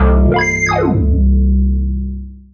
Instrument samples > Synths / Electronic
CVLT BASS 3
bass, bassdrop, clear, drops, lfo, low, lowend, stabs, sub, subbass, subs, subwoofer, synth, synthbass, wavetable, wobble